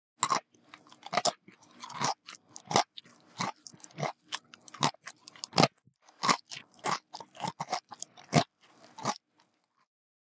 Sound effects > Other
FOODEat Cinematis RandomFoleyVol2 CrunchyBites PeanutsBite OpenMouth SlowChew Freebie
bag, bite, bites, crunch, crunchy, design, effects, foley, food, handling, peanuts, plastic, postproduction, recording, rustle, SFX, snack, sound, texture